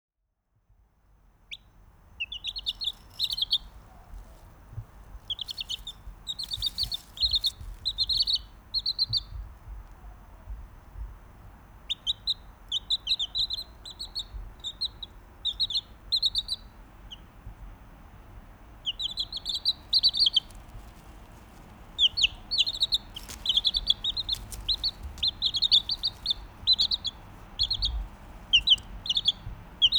Sound effects > Animals
Wood Sandpiper Bird Calls 2
Recorded in springtime in a Brisbane, suburban backyard on a Zoom H4n Pro.